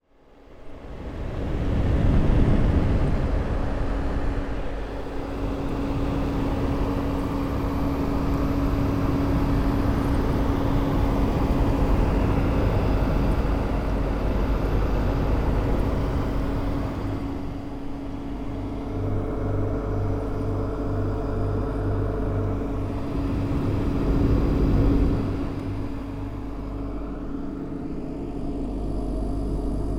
Sound effects > Experimental
Dehumidifier Sound Exploration
"Sound Exploration" is a series where I explore different sonic qualities of a subject by moving the microphone. Frequently, to explore an object before recording it. In this case, a movable home dehumidifier. Subject : A portable home dehumidifier OLS12-009-1 by Finether. Date YMD : 2025 06 05 Location : Albi France Indoors. Hardware : Tascam FR-AV2, Rode NT5 handheld. Weather : Processing : Trimmed and Normalized in Audacity. Some fade in/out. Notes : Volume adjusted independently.
Dehumidifier, dynamic, Finether, FR-AV2, home-appliance, indoors, mic-position-search, moving-mic, NT5, OLS12-009-1, Rode, Sound-Exploration, Tascam